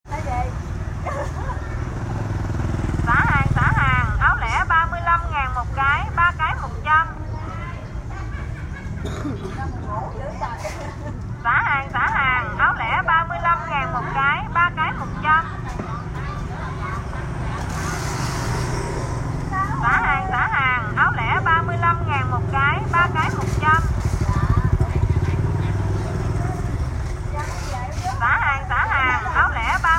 Speech > Solo speech
Woman sell stuff. Record use iPhone 7 Plus smart phone 2026.01.12 16:43